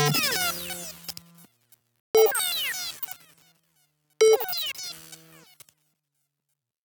Sound effects > Electronic / Design
Sythed with phaseplant only. Processed with Vocodex and Fracture.